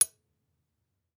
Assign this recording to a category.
Sound effects > Other mechanisms, engines, machines